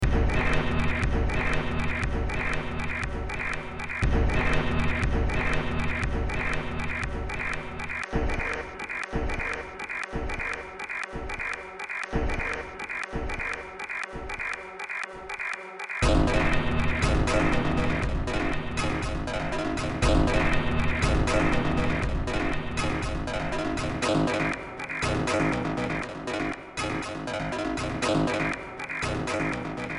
Music > Multiple instruments
Demo Track #3315 (Industraumatic)
Ambient, Soundtrack, Horror, Cyberpunk, Industrial, Games, Underground, Noise, Sci-fi